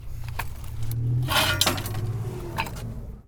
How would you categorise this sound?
Sound effects > Objects / House appliances